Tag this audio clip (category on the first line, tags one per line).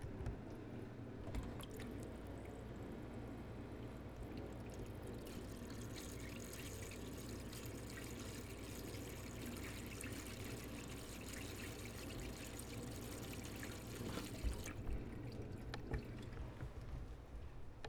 Sound effects > Natural elements and explosions
fountain
water
field-recording
nature
ambient